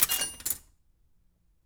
Sound effects > Other mechanisms, engines, machines
metal shop foley -179
fx, little, metal, perc, percussion, pop, rustle, tink, tools